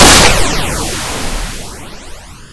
Sound effects > Electronic / Design
loud and bassy impact static
A loud and bassy death noise I made with audacity.